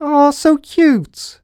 Speech > Solo speech
Affectionate Reactions - Awwn so cute
U67, reaction, Neumann, affectionate, aww, Single-take, Vocal, FR-AV2, Video-game, Voice-acting, oneshot, singletake, voice, Tascam, Man, Human, Male, dialogue, Mid-20s, talk, NPC